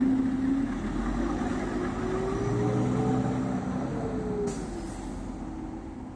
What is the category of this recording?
Sound effects > Vehicles